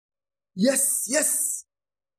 Speech > Solo speech
Repeated Yes yes
Saying yes yes repeatedly twice. Recorded with phone mobile device NEXG N25
Accept, agree, Approve, Correct, Correction, Yes